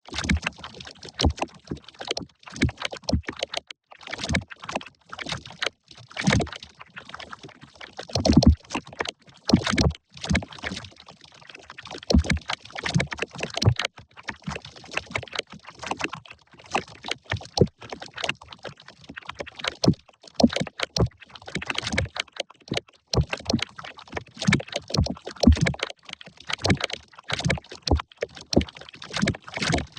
Sound effects > Electronic / Design
ROS-Random Rumble Texture 1
Synthesized exclusively using Phaseplant. For final processing, I utilized Vocodex, Fruity Limiter, and ZL Equalizer.
synthed, FX